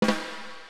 Music > Solo percussion
Snare Processed - Oneshot 153 - 14 by 6.5 inch Brass Ludwig
percussion, beat, rim, drums, hits, realdrum, acoustic, ludwig, hit, flam, snares, sfx, snaredrum, perc, roll